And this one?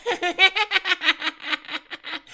Sound effects > Human sounds and actions
creepy laugh
laugh, spooky